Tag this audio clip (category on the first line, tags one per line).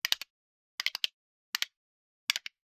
Instrument samples > Percussion
Gallop
Hit
Horse
Minimal
Musical
Percussion
Slap
Spoon
Strike
Wood